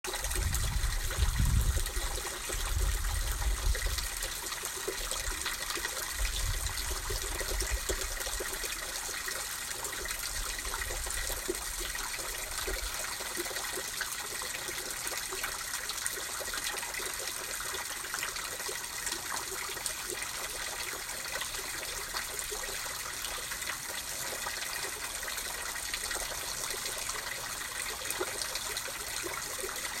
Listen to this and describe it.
Soundscapes > Nature
Collecting first rain water into buckets
Collecting water in buckets
field-recordings, water